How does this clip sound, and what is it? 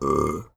Sound effects > Human sounds and actions
HMNBurp-Blue Snowball Microphone Low, Throaty Nicholas Judy TDC
A low and throaty burp.
belch, low, Blue-brand, throaty, Blue-Snowball, burp